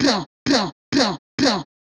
Speech > Solo speech
BrazilFunk Vocal Chop One-shot 1 130bpm
BrazilFunk, FX, One-shot, Vocal